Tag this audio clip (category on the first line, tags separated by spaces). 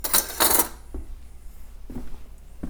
Sound effects > Objects / House appliances
Wobble Vibrate Beam Metal metallic ting Trippy FX SFX Perc Klang ding Clang Foley Vibration